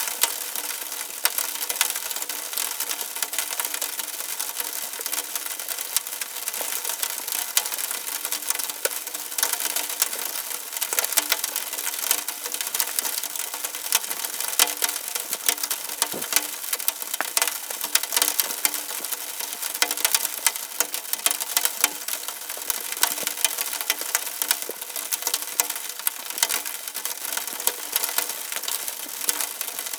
Sound effects > Natural elements and explosions
Snow/Sleet on Glass Pane - Contact Mic
Slapped my (Metal Marshmallow) contact mic onto a pane of glass and laid it in the yard to catch the snow/sleet. Recorded into a Zoom F3.
ambience, ambient, asmr, contact, crackle, f3, glass, marshmallow, metal, mic, pop, sleet, snow, texture, winter, zoom